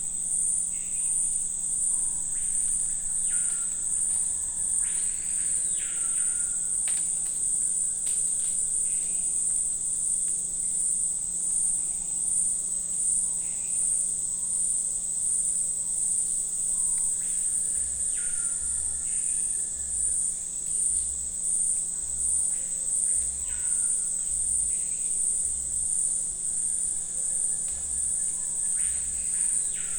Soundscapes > Nature
AMBTrop Novo Airão, Amazonas, Brasil-Ambience, forest, branch snap, twigs, cricrió seringueiro (Lipaugus vociferans), other birds, cicadas, afternoon FILI URPRU
Ambiência. Canto de pássaros, cigarras, cricrió seringueiro, folhas secas, tarde, estalo de galhos, música distante. Gravado próximo à Cabana Tucuxi, Novo Airão, Amazonas, Amazônia, Brasil. Gravação parte da Sonoteca Uirapuru. Em stereo, gravado com Zoom H6. // Sonoteca Uirapuru Ao utilizar o arquivo, fazer referência à Sonoteca Uirapuru Autora: Beatriz Filizola Ano: 2025 Apoio: UFF, CNPq. -- Ambience. Birds chirp, cicadas, cricrió seringueiro, dry leaves, twigs snap. Recorded next to Cabana Tucuxi, Novo Airão, Amazonas, Amazônia, Brazil. This recording is part of Sonoteca Uirapuru. Stereo, recorded with the Zoom H6. // Sonoteca Uirapuru When using this file, make sure to reference Sonoteca Uirapuru Author: Beatriz Filizola Year: 2025 This project is supported by UFF and CNPq.
afternoon, amazon, amazonas, branch, brazil, cicadas, cricrio, faint-music, field-recording, forest, soundscape, tropical, twigs